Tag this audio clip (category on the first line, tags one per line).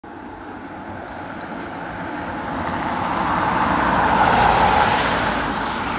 Soundscapes > Urban
field-recording
Car
Drive-by